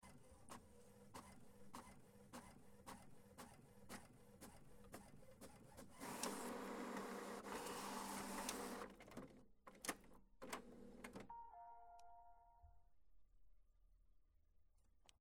Sound effects > Objects / House appliances
HP Printer - Printing 2
Recorded on TASCAM - DR-05X. My HP printer at home.
HP, print, printer, printing